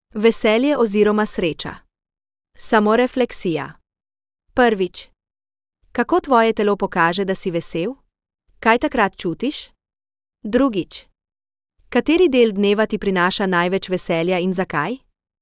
Speech > Solo speech
women, speech, questions, emotions, cards, happiness. The sounds were created using the WooTechy VoxDo app, where we converted the text with questions into an audio recording.